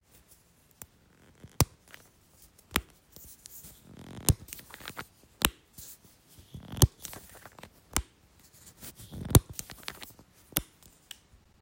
Sound effects > Objects / House appliances
Household objects for sound recognition game